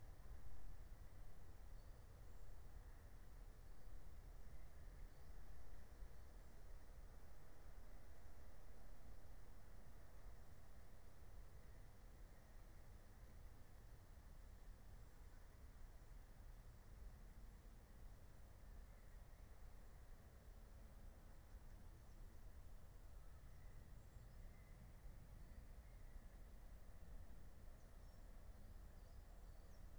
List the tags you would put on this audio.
Soundscapes > Nature
alice-holt-forest
field-recording
meadow
natural-soundscape
phenological-recording
raspberry-pi
soundscape

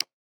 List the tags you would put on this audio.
Sound effects > Human sounds and actions
activation
interface
button
toggle
switch
off
click

